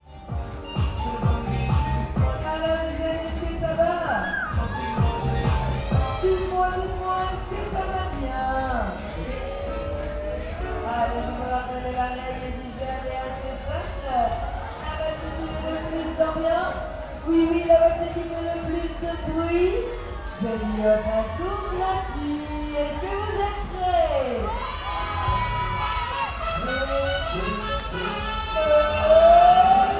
Soundscapes > Urban
250424 173154-1 FR Teen-agers enjoying funfair in Paris
Teen-agers enjoying a fairground ride at a funfair in Paris, France. (1st file) I made this recording while teen-agers were enjoying a fairground ride in a famous funfair called ‘’la Foire du Trône’’, taking place in eastern Paris (France), every year during late spring. Recorded in April 2025 with a Zoom H6essential (built-in XY microphones). Fade in/out applied in Audacity.
noise, atmosphere, roller-coaster, machine